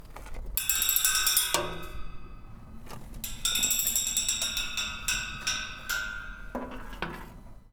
Sound effects > Objects / House appliances
Junkyard Foley and FX Percs (Metal, Clanks, Scrapes, Bangs, Scrap, and Machines) 147
Ambience, Atmosphere, Bang, Bash, Clang, Clank, Dump, dumping, dumpster, Environment, Foley, FX, garbage, Junk, Junkyard, Machine, Metal, Metallic, Perc, Percussion, rattle, Robot, Robotic, rubbish, scrape, SFX, Smash, trash, tube, waste